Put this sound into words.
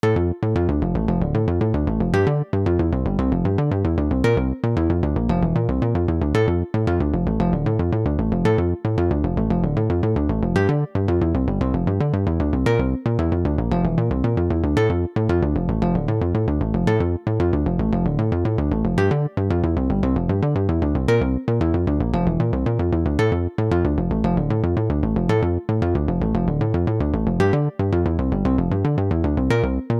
Music > Multiple instruments
Ableton Live. VST Surge,Purity....Musical Composition Free Music Slap House Dance EDM Loop Electro Clap Drums Kick Drum Snare Bass Dance Club Psytrance Drumroll Trance Sample .